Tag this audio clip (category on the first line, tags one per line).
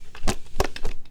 Sound effects > Objects / House appliances
click
industrial
plastic